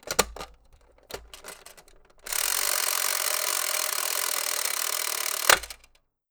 Sound effects > Objects / House appliances
A toy toaster pushing down, timer runs and popping up.